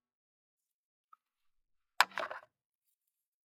Sound effects > Other mechanisms, engines, machines
Dewalt 12 inch Chop Saw foley-031
Blade, Circularsaw, FX, Metal, Perc, Saw, Shop, Teeth, Tooth, Woodshop